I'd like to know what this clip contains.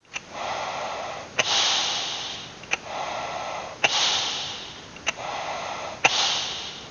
Sound effects > Human sounds and actions
The sound of breathing while wearing a Canadian C3 gas mask, featuring the distinctive inhale/exhale valve sound, recorded by me using a Logitech G533